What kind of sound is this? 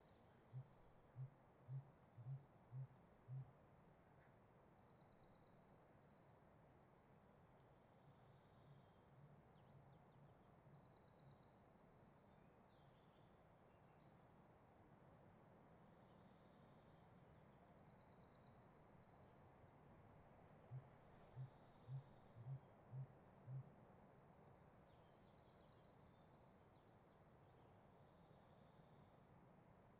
Soundscapes > Nature
AMBForst Grouse Calls, Cicadas ShaneVincent PCT25 20250715
Loud cicadas, and a healthy dosage of the low swooping male grouse call commonly found (and commonly hiker-confusing) in the Southern Sierras. This recording, along with the others in this pack, were taken during a 50-day backpacking trip along a 1000 mile section of the Pacific Crest Trail during the summer of 2025. Microphone: AKG 214 Microphone Configuration: Stereo AB Recording Device: Zoom F3 Field Recorder
birds, cicadas, field-recording, grouse